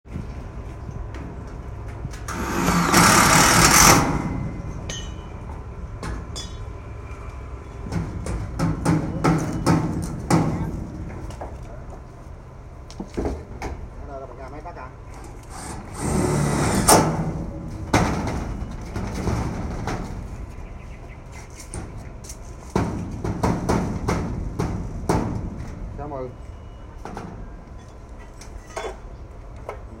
Soundscapes > Other
Dán Tôn Nhà - Rebuild House
Work sound rebuild house. Have people talk too. Record use iPhone 7 Plus 2025.06.03 15:02
construction, drill